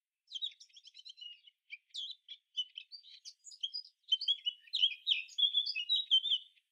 Sound effects > Animals
A morning recording of an Eurasian Blackcap. Edited in Rx11.
Bird, birds, blackcap, chirp, field-recording, morning, nature, songbird